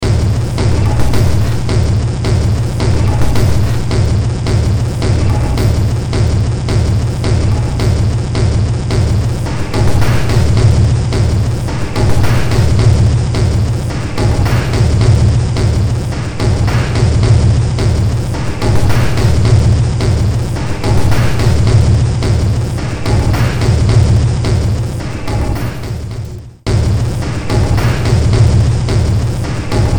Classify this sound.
Music > Multiple instruments